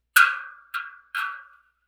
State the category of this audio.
Sound effects > Objects / House appliances